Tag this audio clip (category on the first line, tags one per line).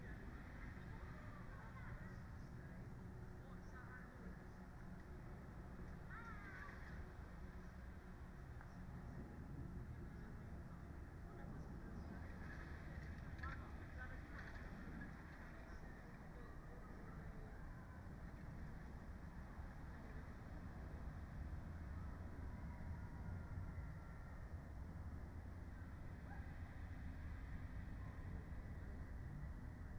Soundscapes > Nature
natural-soundscape
field-recording
Dendrophone
phenological-recording
sound-installation
artistic-intervention
weather-data
modified-soundscape
soundscape
data-to-sound
raspberry-pi
nature
alice-holt-forest